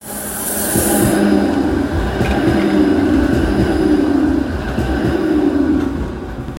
Sound effects > Vehicles
A tram passing by from distance on Insinöörinkatu 23 road, Hervanta aera. Recorded in November's afternoon with iphone 15 pro max. Road is wet.
rain, tampere, tram